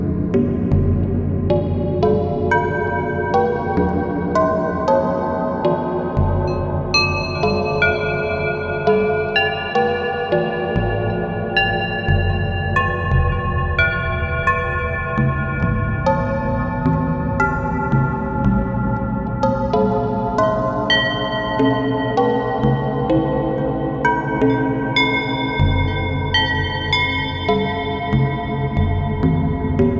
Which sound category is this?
Soundscapes > Synthetic / Artificial